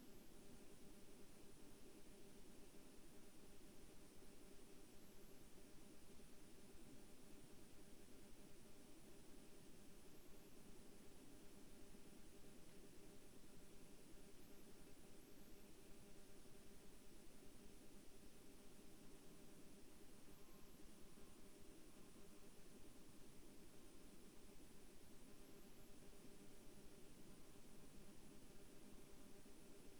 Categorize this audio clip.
Soundscapes > Nature